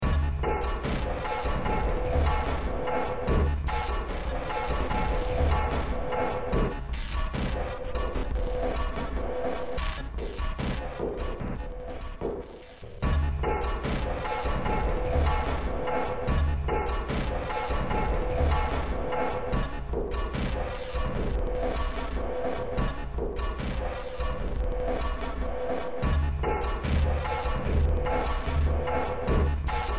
Music > Multiple instruments
Short Track #3236 (Industraumatic)
Ambient, Cyberpunk, Games, Horror, Industrial, Noise, Sci-fi, Soundtrack, Underground